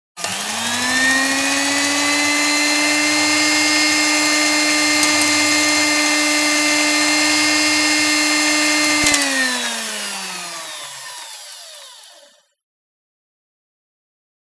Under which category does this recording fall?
Sound effects > Objects / House appliances